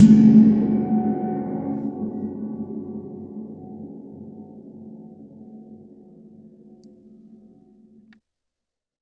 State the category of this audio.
Music > Solo instrument